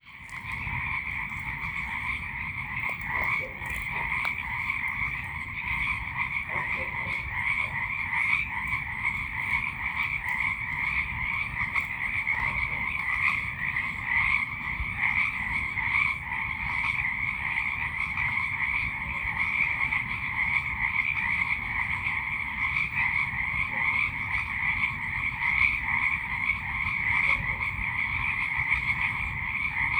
Soundscapes > Nature
Frogs in Redwood Marsh, Distant Dog Bark (RX Spectral Noise Reduction)
A recording of frogs going off in my redwood neighborhood, sitting at a dark marsh wetland area, distant dog barks echoing through the woods
ambiance, ambience, ambient, animal, atmosphere, bird, birds, chirp, chirping, field-recording, frog, frogs, frogsound, humboldt, insects, marsh, nature, redwood, redwoods, sfx, spring, wetland, wetlands